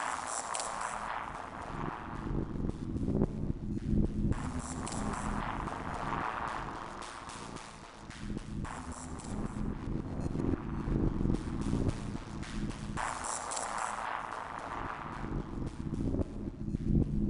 Instrument samples > Percussion
This 111bpm Drum Loop is good for composing Industrial/Electronic/Ambient songs or using as soundtrack to a sci-fi/suspense/horror indie game or short film.
Alien, Ambient, Dark, Drum, Industrial, Loop, Loopable, Packs, Samples, Soundtrack, Underground, Weird